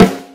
Instrument samples > Percussion
A&F Drum Co. 5.5x14 Steam Bent Solid Maple Shell Whisky Field Snare 1
An untriggered deep mainsnare. It requires triggering (= attack sample with a puny timbre layering) to become a deathdoom mainsnare. The deep-sounding mainsnare A&F Drum Co. 5.5x14 Steam Bent Solid Maple Shell Whisky Field Snare. Good for doom death metal. And good in general.
strike, percussion, thrash-metal, timpano, pop, drum, drums, deepsnare, snare, active-snare, bass-snare, mainsnare, doomsnare, death-metal, goodsnare, percussive, doom-death, kingsnare, doom, rock, main-snare, doom-metal, metal, snared-drum, deathsnare